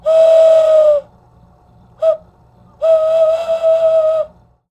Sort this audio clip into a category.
Sound effects > Animals